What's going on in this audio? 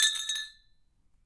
Sound effects > Other mechanisms, engines, machines
metal shop foley -230
strike, wood, bop, knock, sound